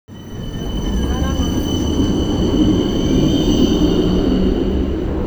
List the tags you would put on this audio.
Sound effects > Vehicles
rail vehicle tram

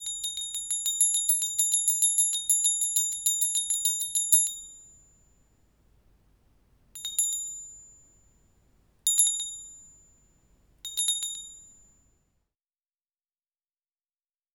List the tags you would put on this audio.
Sound effects > Other
bell ring tingle

